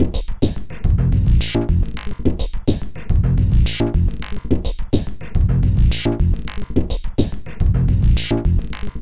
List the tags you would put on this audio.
Instrument samples > Percussion
Drum
Loopable
Alien
Samples
Ambient
Packs
Dark
Loop
Underground
Weird
Soundtrack
Industrial

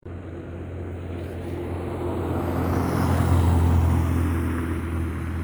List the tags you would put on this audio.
Sound effects > Vehicles
car
engine
vehicle

